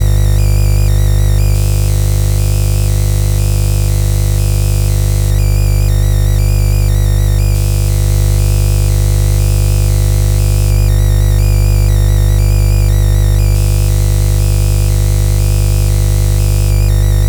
Sound effects > Objects / House appliances
Electromagnetic field recording of a Nespresso Coffee machine while making coffee. Electromagnetic Field Capture: Electrovision Telephone Pickup Coil AR71814 Audio Recorder: Zoom H1essential